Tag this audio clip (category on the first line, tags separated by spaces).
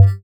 Instrument samples > Synths / Electronic
fm-synthesis additive-synthesis bass